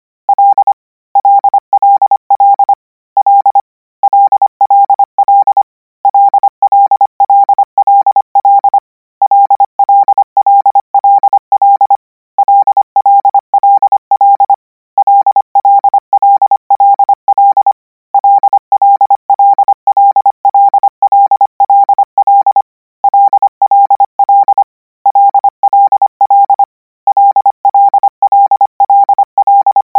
Sound effects > Electronic / Design
Koch 09 L - 200 N 25WPM 800Hz 90%
Practice hear letter 'L' use Koch method (practice each letter, symbol, letter separate than combine), 200 word random length, 25 word/minute, 800 Hz, 90% volume.
code, codigo, letters, morse, radio